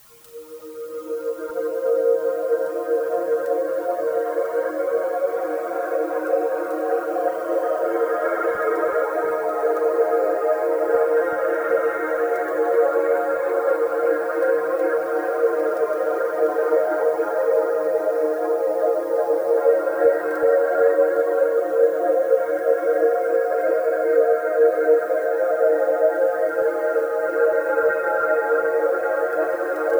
Instrument samples > Synths / Electronic
a cold synthscape dronish bit, probably made in FL (bec I don't remember anymore!). I would have utilized fruity grainulizer and some chorus, reverbing, and filtering, the dry signal masked by all the effects.